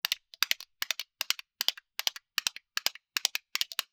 Instrument samples > Percussion
MusicalSpoon Small Gallop
Hit, Horse, Spoon